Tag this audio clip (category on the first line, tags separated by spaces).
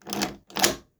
Sound effects > Other mechanisms, engines, machines
cowboy gun leveraction rifle western